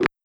Sound effects > Human sounds and actions
LoFiFootsteps Stone Running-06
Shoes on stone and rocks, running. Lo-fi. Foley emulation using wavetable synthesis.
running, footstep, run, jog, rocks, stone, jogging, synth, lofi, steps